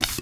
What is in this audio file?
Sound effects > Objects / House appliances
Recording of a person blowing into a straw, loaded with a spitball and firing it
blow, blowing, foley, shot, Spitball, Straw